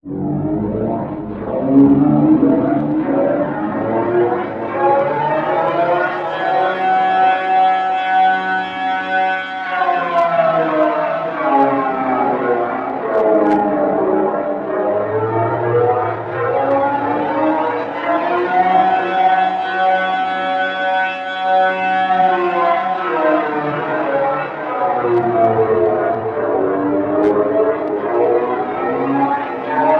Sound effects > Other mechanisms, engines, machines
Ominous air raid siren created using VSTs and effects: a long note of a few brass and wind instruments made in Mixcraft and then heavily edited with effects in Goldwave and Audacity
air, horror, ominous, raid, siren